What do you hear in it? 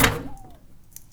Sound effects > Other mechanisms, engines, machines
Handsaw Oneshot Hit Stab Metal Foley 14
percussion, hit, household, plank, metal, saw, tool, foley, shop, twangy, vibe, metallic, fx, vibration, smack, perc, handsaw, twang, sfx